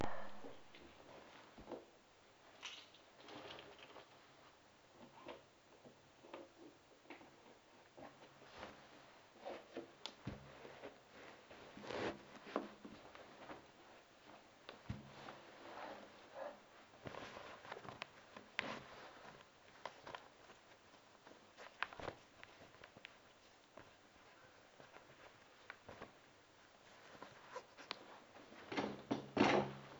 Soundscapes > Urban
Old recording, made probably with phone, during one of many 2015's car rides. Some highlights: #0:00 Dressing up #0:50 Leaving #1:55 Elevator #2:40 Going to car #3:50 Ride
ride, car, engine